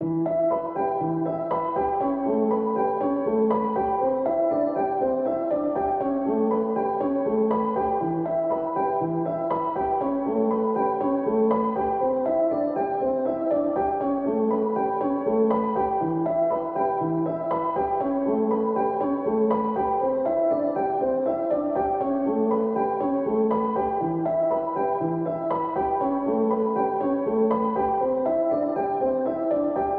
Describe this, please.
Music > Solo instrument
Piano loops 190 efect 4 octave long loop 120 bpm
120, 120bpm, free, loop, music, piano, pianomusic, reverb, samples, simple, simplesamples